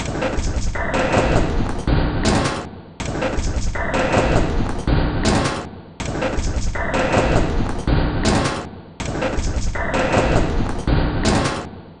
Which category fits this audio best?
Instrument samples > Percussion